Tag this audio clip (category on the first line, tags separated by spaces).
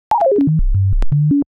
Sound effects > Electronic / Design
BEEP BOOP CHIPPY CIRCUIT COMPUTER DING ELECTRONIC EXPERIMENTAL HARSH INNOVATIVE OBSCURE SHARP SYNTHETIC UNIQUE